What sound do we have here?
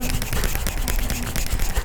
Sound effects > Human sounds and actions
sound of an eraser on paper. Recorded by myself on a Zoom Audio Recorder.
erase, scrathing